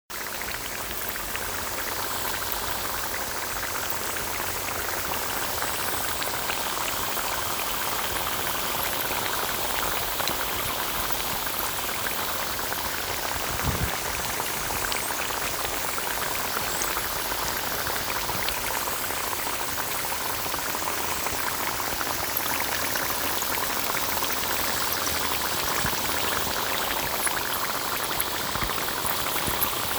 Sound effects > Objects / House appliances
Bubbling L Hammer
This sounds like liquid bubbling, but I think it was a rumbly old escalator that sounded like cartoon bubbling, use at will